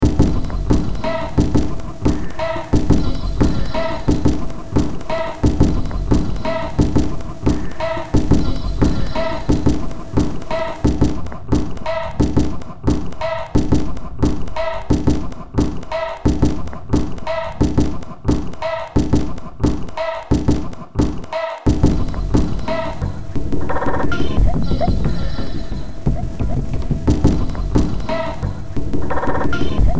Music > Multiple instruments
Demo Track #3685 (Industraumatic)
Sci-fi, Cyberpunk, Soundtrack, Underground, Industrial